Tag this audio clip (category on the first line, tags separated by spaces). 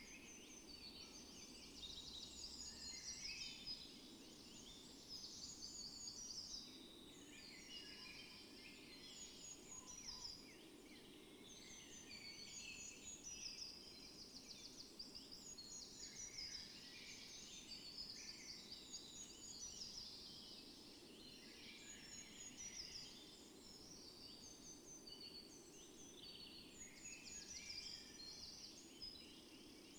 Soundscapes > Nature
data-to-sound natural-soundscape weather-data sound-installation raspberry-pi soundscape phenological-recording modified-soundscape field-recording Dendrophone alice-holt-forest nature artistic-intervention